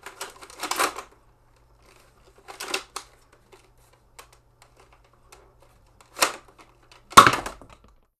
Sound effects > Objects / House appliances
TOYMisc-Samsung Galaxy Smartphone, CU Nerf Tetrashot, Cock, Shoot, Load Nicholas Judy TDC
A Nerf Tetrashot cocking, loading and shooting.
cock, foley, load, nerf, nerf-tetrashot, Phone-recording, shoot, tetrashot